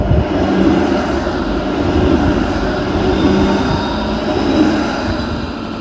Soundscapes > Urban
ratikka3 copy
traffic, tram, vehicle